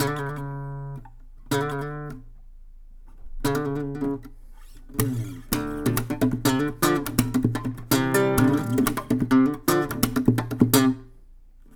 Music > Solo instrument
acoustic guitar slap 2
acosutic, chords, dissonant